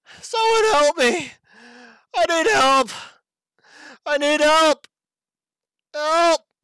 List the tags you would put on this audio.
Speech > Solo speech
agression
military
Desparate
army

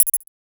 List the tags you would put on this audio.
Sound effects > Other mechanisms, engines, machines
Button
Light
Switch

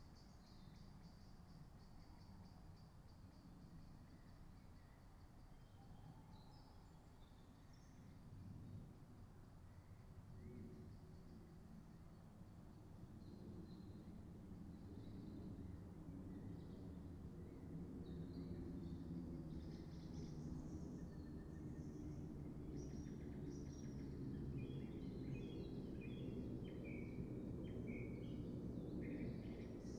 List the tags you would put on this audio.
Soundscapes > Nature
alice-holt-forest
soundscape
artistic-intervention
natural-soundscape
field-recording
modified-soundscape
nature
weather-data
phenological-recording
raspberry-pi
sound-installation
Dendrophone
data-to-sound

